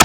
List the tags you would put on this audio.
Instrument samples > Percussion
drums 1lovewav sample 1-shot drum kit snare percussion

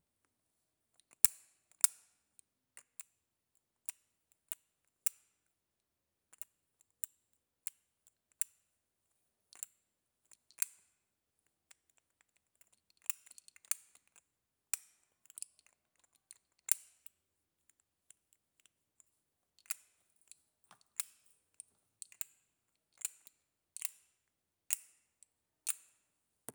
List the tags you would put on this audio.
Sound effects > Experimental
Magnet Magnetic Metal

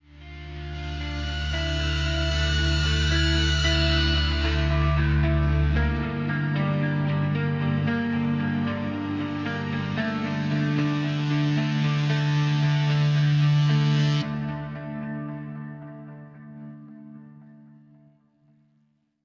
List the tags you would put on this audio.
Music > Other
electric depressive sample guitar

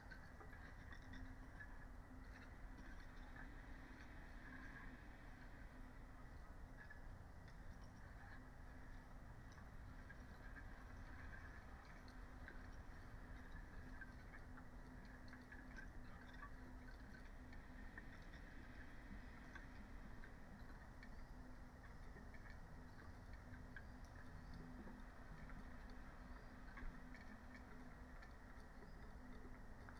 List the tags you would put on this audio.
Soundscapes > Nature
artistic-intervention weather-data field-recording nature alice-holt-forest Dendrophone natural-soundscape phenological-recording raspberry-pi modified-soundscape soundscape data-to-sound sound-installation

